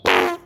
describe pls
Sound effects > Other
Genuine fart recorded with smartphone.
fart, flatulence, gas